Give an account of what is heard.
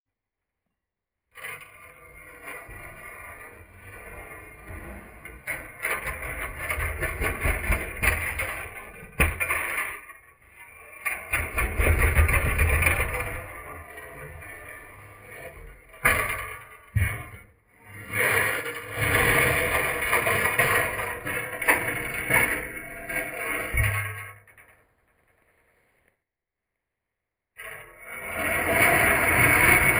Sound effects > Animals
Maybe Godzilla? Almost but usually I sometimes think using instruments for your Giant monsters or animals is creative (Hey, that's what Akira Ifukube did for the Toho Kaiju).